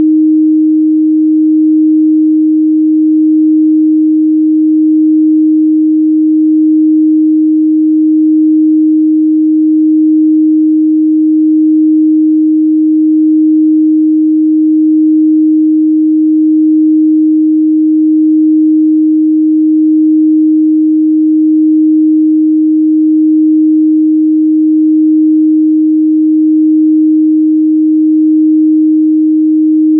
Sound effects > Other
311 13Hz Solfeggio Frequency - Pure Sine Wave - 3D Spin
311_13Hz Solfeggio Frequency - Pure Sine Wave - 3D Spin May be someone will find it useful as part of their creative work :)
tibet, om, yogic, tone, chant, Pythagoras, 3d, buddhist, solfeggio, aum, hz, tibetan, frequency, gregorian, ring-tone, spin